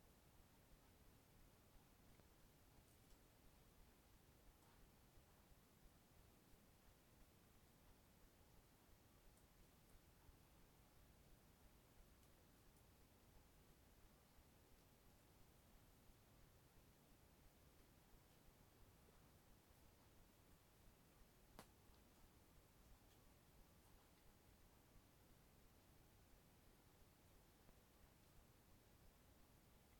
Soundscapes > Nature
24h ambiance pt-02 - 2025 04 15 21h00 - 00h00 Gergueil Greenhouse
Subject : One part out of ten of a 24h MS recording of Gergueil country side. Recorded inside a Greenhouse. Date YMD : Project starting at 20h20 on the 2025 04 15, finishing at 20h37 on the 2025 04 16. Location : Gergueil 21410, Côte-d'Or, Bourgogne-Franche-Comté. Hardware : Zoom H2n MS, Smallrig Magic-arm. At about 1m60 high. Weather : Rainy, mostly all night and day long. Processing : Trimmed added 5.1db in audacity, decoded MS by duplicating side channel and inverting the phase on right side. (No volume adjustment other than the global 5.1db).
Cote-dor, early-morning, plastic